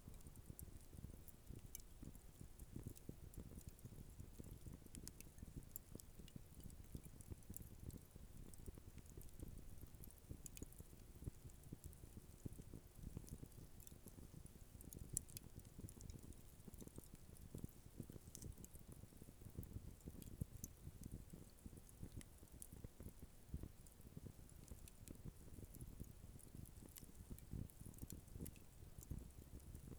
Soundscapes > Nature
Field recording of a dying campfire's embers with wind, plane, and vehicle noises in the background.